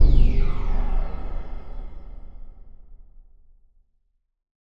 Sound effects > Electronic / Design
RUMBLY OBSCURE BOOM
BASSY, BOOM, DEEP, DIFFERENT, EXPERIMENTAL, EXPLOSION, HIPHOP, HIT, IMPACT, INNOVATIVE, LOW, RAP, RATTLING, RUMBLING, TRAP, UNIQUE